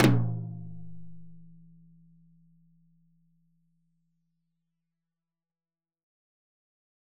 Music > Solo percussion
Med-low Tom - Oneshot 29 12 inch Sonor Force 3007 Maple Rack
perc, drumkit, tomdrum, recording, kit, percussion, Medium-Tom, realdrum, roll, drums, oneshot, real, maple, loop, med-tom, acoustic, flam, quality, Tom, beat, toms, wood, drum